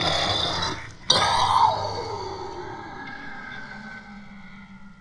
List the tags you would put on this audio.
Sound effects > Experimental
Alien Animal boss Creature Deep demon devil Echo evil Fantasy Frightening fx gamedesign Groan Growl gutteral Monster Monstrous Ominous Otherworldly Reverberating scary sfx Snarl Snarling Sound Sounddesign visceral Vocal Vox

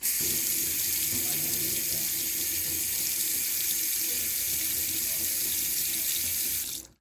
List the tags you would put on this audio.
Sound effects > Objects / House appliances
turn-on run Phone-recording turn-off sink

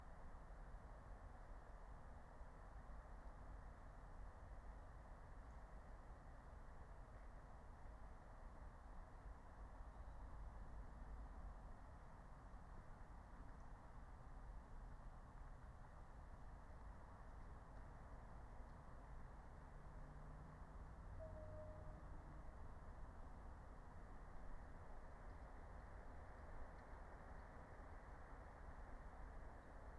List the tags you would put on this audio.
Soundscapes > Nature
alice-holt-forest meadow natural-soundscape nature phenological-recording raspberry-pi

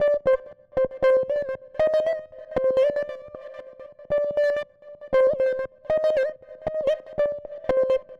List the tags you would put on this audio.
Music > Solo instrument
absynth4 alchemy flute lowpass nativeinstruments pitchmod scream synthesizer waveshape

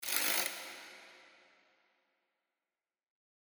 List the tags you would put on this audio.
Sound effects > Experimental
blinds close door experiment lock open scrape Scraping slide sliding weapon weird

